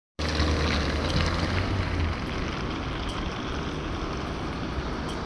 Soundscapes > Urban
car sound 6
Car, tires, passing, studded